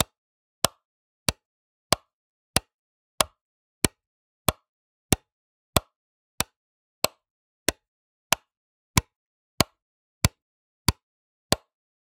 Sound effects > Objects / House appliances
Light Switch - Flipping On and Off (Variations)
Flipping the light switch at home in various mic positions, leading to various resonances and colours. Recorded with my Lewitt 540S - Cleaned and edited to sound natural. Normalised at -2dBFS. Recorded in the Netherlands, Europe, 2025.